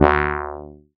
Instrument samples > Synths / Electronic
MEOWBASS 1 Gb
bass, additive-synthesis, fm-synthesis